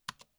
Sound effects > Other mechanisms, engines, machines
Single Key Stroke Large Key
A single keystroke of a larger key on a mechanical keyboard. Recorded using a Pyle PDMIC-78
Clicking, key, Mechanical, Tapping